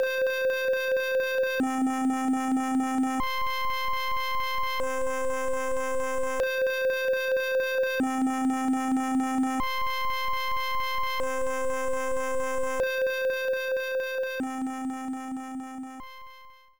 Instrument samples > Synths / Electronic
silly synths
instrumnents made by playing with the expononet thing on furnace tracker's wavetable editor ignore that it loops and then fades, i set it to loop on accident.